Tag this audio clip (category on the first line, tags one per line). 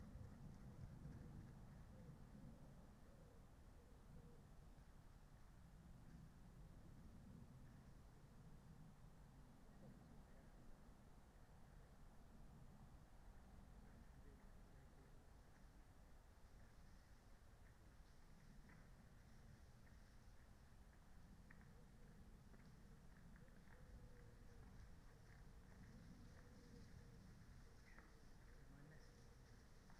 Soundscapes > Nature
alice-holt-forest
Dendrophone
field-recording
modified-soundscape
raspberry-pi
sound-installation
soundscape